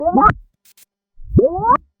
Sound effects > Electronic / Design
Electronic modulation 3
A short electronic modulation created using Audacity.
glitch, electric, game-audio, sci-fi, sweep, electronic, effect, digital, synth, wobble, soundeffect, modulation, pulse, sound-design